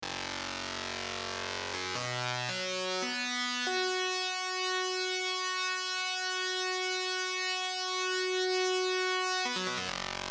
Sound effects > Other mechanisms, engines, machines
They were euqipped with these loud current choppers made by Jeumont-Schneider.